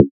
Instrument samples > Synths / Electronic
FATPLUCK 8 Db
additive-synthesis, bass, fm-synthesis